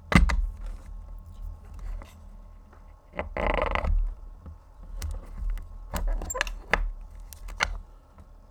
Sound effects > Objects / House appliances
A mechanical pencil sharpener putting down, locking, unlocking and picking up.